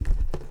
Sound effects > Objects / House appliances
A bucket being hit in vatious ways recorded by a usb mic trimmed short for possible imapact layering/ foley uses and raw. Visit my links for more Sound packs.